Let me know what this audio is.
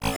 Sound effects > Electronic / Design
RGS-Glitch One Shot 13
Processed with ZL EQ and Waveshaper.